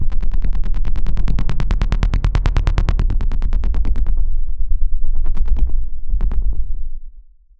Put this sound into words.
Instrument samples > Synths / Electronic
CVLT BASS 105
sub, bassdrop, bass, synth, wobble, lowend, wavetable, subbass, synthbass, subwoofer, stabs, subs, clear, low, drops, lfo